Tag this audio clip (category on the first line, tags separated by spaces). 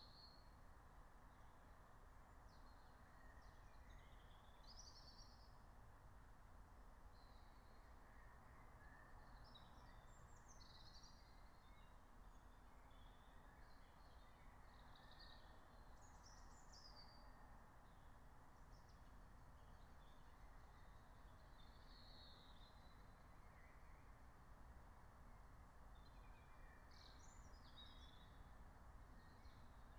Soundscapes > Nature
alice-holt-forest soundscape natural-soundscape raspberry-pi nature meadow